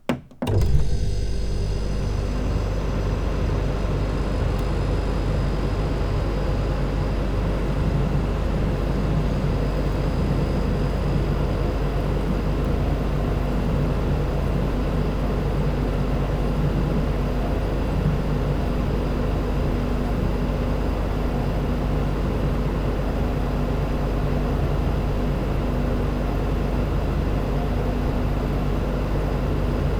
Sound effects > Objects / House appliances
Subject : A portable home dehumidifier OLS12-009-1 by Finether. Date YMD : 2025 06 05 Location : Albi France Indoors. Hardware : Tascam FR-AV2, Two Rode NT5. Left/mic 1 = 2cm away front facing. Right/Mic 2 = 30cm away rear facing. Weather : Processing : Volume adjusted independently. Phase inverted one microphone. You may also want to play around solo-ing one channel, or mixing both into a mono sound.
220v, 50hz-electrisity, Dehumidifier, Finether, FR-AV2, home-appliance, indoors, NT5, OLS12-009-1, Rode, Start-Finish, Tascam, ventilation